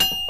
Sound effects > Other mechanisms, engines, machines
metal shop foley -181
boom, tink, bang, sound, tools, wood, knock, little, percussion, crackle, metal, pop, shop, rustle, fx, strike, perc, thud, foley, sfx, oneshot, bam, bop